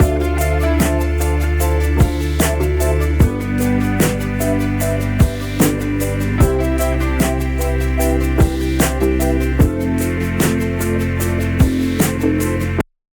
Music > Multiple instruments
DAFT PUNK FULL 2
cool daft punk type beat. Part of a whole beat. AI generated: (Suno v4) with the following prompt: generate a sad and chill instrumental inspired in daft punk or something similar, that will give room for emotional lyrics, in C major, at 75 bpm.
daft, punk, ai-generated, beat